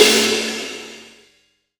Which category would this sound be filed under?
Instrument samples > Percussion